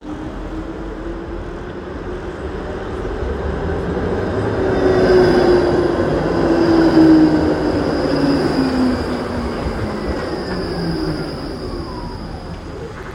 Sound effects > Vehicles
Tram sound
Tram, city